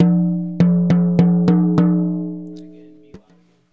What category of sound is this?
Music > Solo percussion